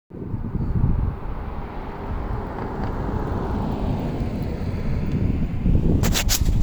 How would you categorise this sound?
Sound effects > Vehicles